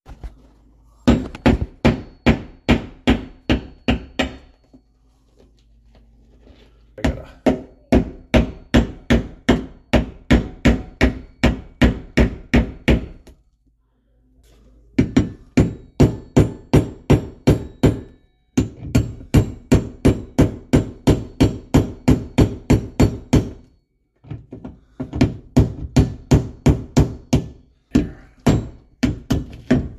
Sound effects > Objects / House appliances
TOOLHand-Samsung Galaxy Smartphone Hammer, Hammering A Nail, Pounding Nicholas Judy TDC
A hammer pounding and hammering a nail.
pound, nail, foley, Phone-recording, hammer